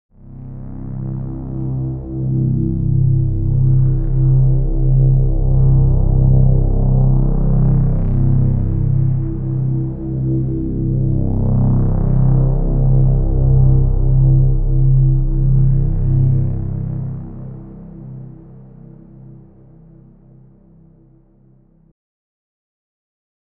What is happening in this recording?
Instrument samples > Synths / Electronic
Deep Pads and Ambient Tones19
Ambient, Analog, bass, bassy, Dark, Deep, Oneshot, Pad, Pads, synthetic, Tone, Tones